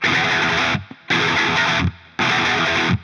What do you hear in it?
Music > Solo instrument
Guitar riff in E
This is made by hitting 5 times the 5th chord and palm muted. Raw, powerful guitar riffs! These are the true sound of a rocker, not machines. Crafted with a real Fender guitar and AmpliTube 5, you're getting genuine, unadulterated guitar energy. Request anything, available to tour or record anywhere!